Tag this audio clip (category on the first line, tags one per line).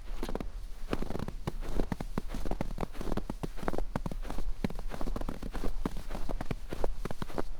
Sound effects > Human sounds and actions
crunchy
field-recording
footsteps
H1n
MovoX1mini
snow
walking